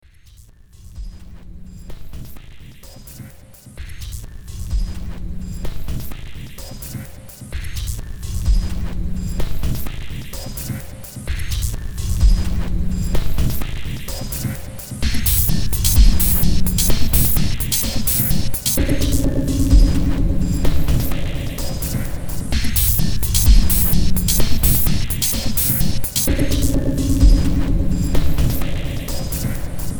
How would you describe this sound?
Music > Multiple instruments
Demo Track #3722 (Industraumatic)
Ambient, Cyberpunk, Games, Horror, Industrial, Noise, Sci-fi, Soundtrack, Underground